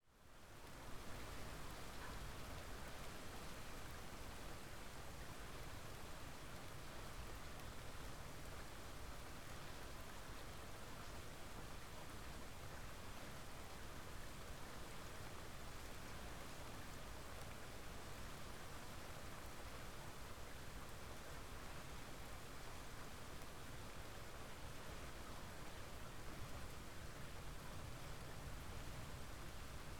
Soundscapes > Nature
Arizona Monsoon, Rain sound
Rain from a Monsoon in Arizona, recorded by me on July 6th 2025, with the occasional far away thunder roaring. Around #11:35 is a BIG close-by lightning strike! My first soundscape recording, there may be faint background noises from time to time!
Arizona, Lightning, Monsoon, Rain, Storm, Thunder, Thunderstorm, Weather